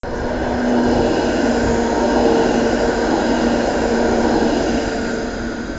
Soundscapes > Urban
Passing Tram 19
tram, field-recording, outside, street, trolley, urban, city, traffic